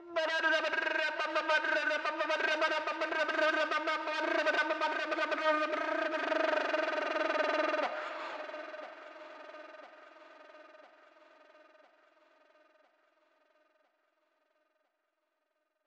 Speech > Other
mimicking the sound of a dirtbike HELL SCREAM YELL
agony, pain, scream